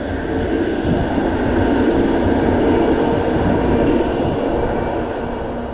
Soundscapes > Urban
Passing Tram 20
city, field-recording, outside, street, traffic, tram, trolley, urban